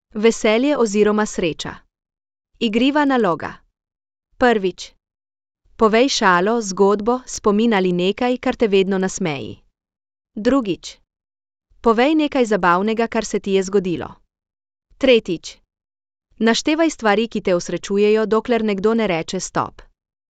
Speech > Solo speech
2. VESELJE - Igriva naloga
women, speech, questions, emotions, cards, happiness. The sounds were created using the WooTechy VoxDo app, where we converted the text with questions into an audio recording.
happiness, questions, cards, women, emotions, speech